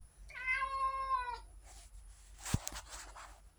Sound effects > Animals
Cat's meow1
This is my cat's meow